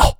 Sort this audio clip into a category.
Speech > Solo speech